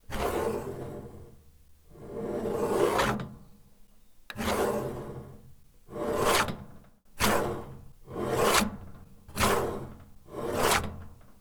Sound effects > Objects / House appliances
Plastic sliding/scraping along a metal shelf, the hollow metal creates reverb